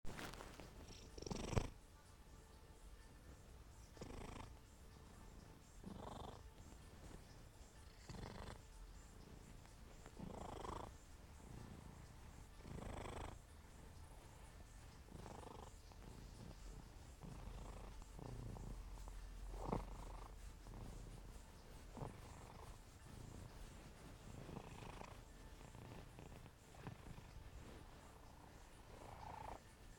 Sound effects > Animals
Cat Purring
Cat's purring Recorded with a phone.